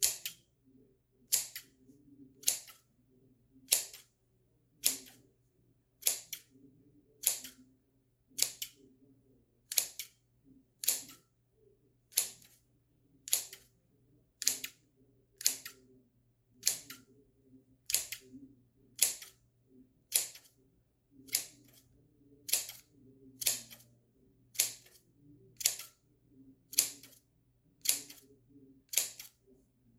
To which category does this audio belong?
Sound effects > Objects / House appliances